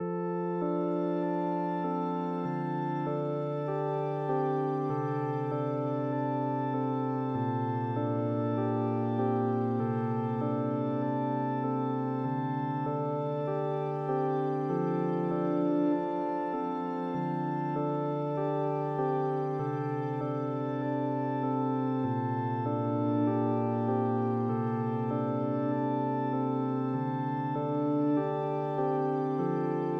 Music > Multiple instruments
Emotional Progressive Loop
A looping emotional track designed for background use, starting with a subtle rise enhanced by a high-pass filter, then gently descending to create a touching, reflective atmosphere. I personally used it in a birthday video with a voice over speech. Technical details: DAW: FL Studio VSTs: reFX Nexus Instruments: Harp, Piano BPM: 90 Pre-made loops: None used AI content: None (composed entirely from scratch)
emotional, rhythm, loop